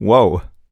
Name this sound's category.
Speech > Solo speech